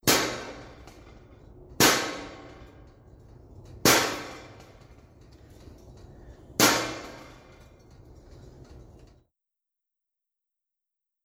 Sound effects > Objects / House appliances
METLImpt-Samsung Galaxy Smartphone, CU Hits, Thin, Cymbal Like Nicholas Judy TDC
Thin, cymbal-like metal hits.
hit, metal, cymbal, Phone-recording, thin